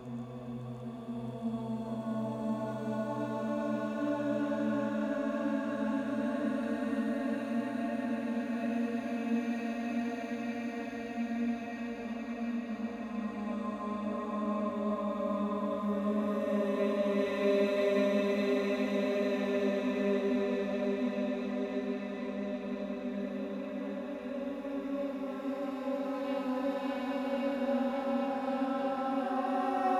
Music > Solo instrument
Angelic Voice - Choir
Two combined recordings of my voice processed through NOI's "Hellebore" module on VCV Rack. Enjoy!